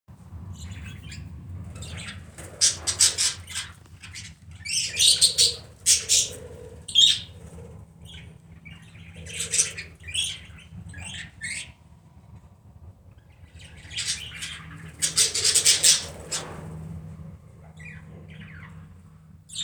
Sound effects > Animals
Parrots - Budgerigar; Small Flock Chirping and Flapping Wings.
Recorded with an LG Stylus 2022 at Wild Georgia Safari Park in Aline, Georgia. A small flock of budgies, known colloquially as parakeets, chirp and flutter. These small Australian birds are one of the most commonly-kept pet birds.